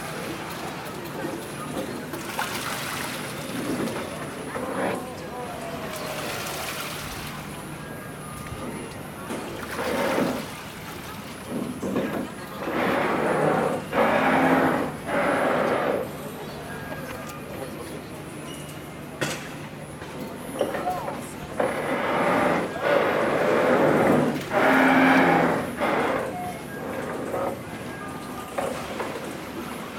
Soundscapes > Urban
04/08/2025 - Istambul, Turkey Eerie bridge sounds recorded on the Bosporus Canal. Street musicians in the background Zoom H2N